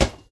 Sound effects > Objects / House appliances
A basketball bounce. Recorded at Goodwill.